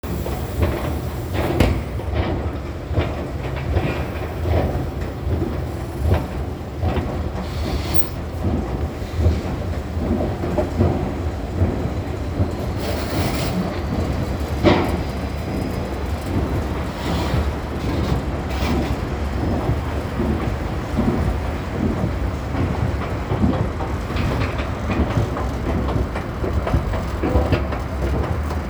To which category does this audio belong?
Soundscapes > Urban